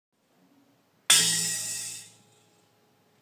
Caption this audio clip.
Instrument samples > Percussion
A conduction i recorded.
Conduction, Hit, Sample